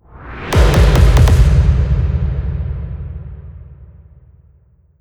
Sound effects > Electronic / Design
Trailer Drum Percussions 140 bpm
Simple Percussion beats, made on LMMS just for a try.